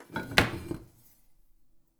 Sound effects > Other mechanisms, engines, machines
Woodshop Foley-105
sfx, pop, bang, bam, tink, rustle, fx, strike, sound, thud, percussion, oneshot, shop, bop, wood, metal, crackle, knock, boom, foley, tools, little, perc